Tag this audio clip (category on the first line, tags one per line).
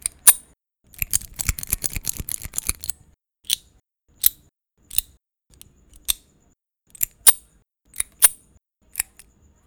Sound effects > Other mechanisms, engines, machines
cock
clip
handgun
reload
gun
magazine
weapon
pistol